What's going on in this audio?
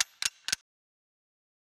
Sound effects > Other mechanisms, engines, machines
Ratchet strap-9
metalic, gears, winding